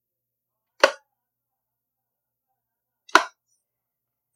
Sound effects > Objects / House appliances
lightswitch on and off
a light switch noise, on and off by me recorded on an ipad
switch, switches, off, click